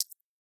Sound effects > Objects / House appliances
Chains Drop 4 Perc
Dropping jewellery chains and necklaces in various thicknesses, recorded with an AKG C414 XLII microphone.